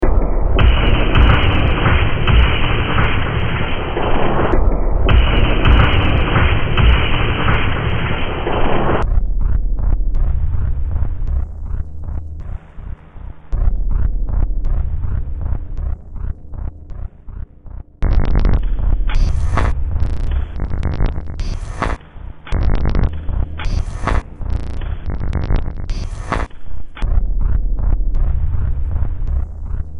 Music > Multiple instruments
Demo Track #3285 (Industraumatic)
Ambient, Cyberpunk, Games, Horror, Industrial, Noise, Sci-fi, Soundtrack, Underground